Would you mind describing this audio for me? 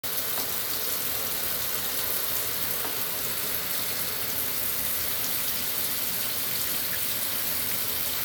Sound effects > Objects / House appliances
Shower Running (no reverb)
Shower running in a bathroom.
bathroom, drip, plumbing, running, shower, water